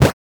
Instrument samples > Percussion
8 bit-Noise Percussion9
percussion, FX, game, 8-bit